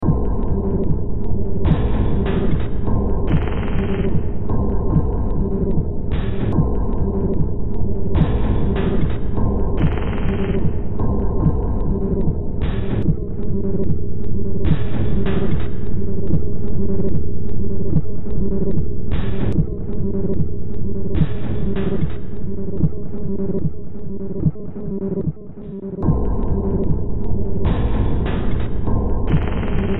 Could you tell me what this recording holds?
Music > Multiple instruments
Demo Track #3436 (Industraumatic)
Ambient, Cyberpunk, Games, Horror, Industrial, Noise, Sci-fi, Soundtrack, Underground